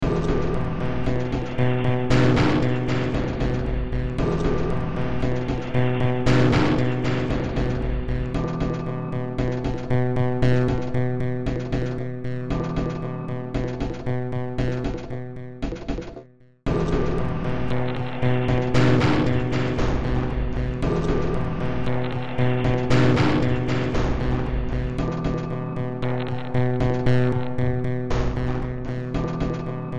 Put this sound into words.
Music > Multiple instruments
Demo Track #3920 (Industraumatic)
Sci-fi, Games, Soundtrack, Noise, Cyberpunk, Horror, Ambient, Underground, Industrial